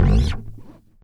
Music > Solo instrument
fret slide noise 4
blues, rock, harmonic, notes, pick, electric, basslines, chuny, chords, slide, slides, fuzz, bassline, riffs, lowend, pluck, low, bass, riff, electricbass, slap, note, funk, harmonics